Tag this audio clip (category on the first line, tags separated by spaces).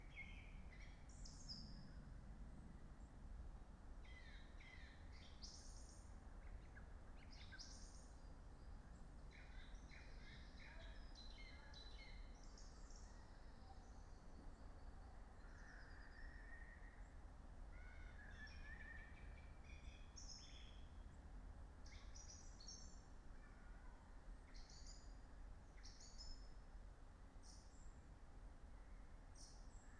Soundscapes > Nature
alice-holt-forest Dendrophone data-to-sound field-recording nature modified-soundscape phenological-recording sound-installation raspberry-pi soundscape artistic-intervention natural-soundscape weather-data